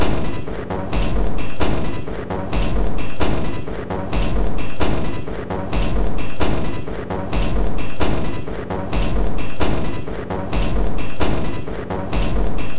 Instrument samples > Percussion
This 150bpm Drum Loop is good for composing Industrial/Electronic/Ambient songs or using as soundtrack to a sci-fi/suspense/horror indie game or short film.
Samples, Drum, Dark, Loopable, Alien, Packs, Industrial, Underground, Loop, Ambient, Weird, Soundtrack